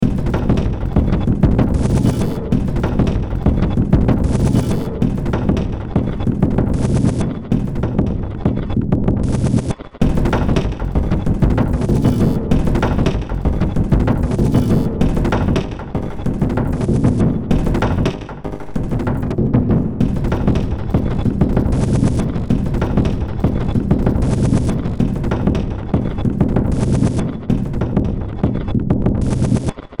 Music > Multiple instruments
Short Track #3538 (Industraumatic)
Cyberpunk, Sci-fi, Soundtrack, Ambient, Industrial, Underground, Noise, Games, Horror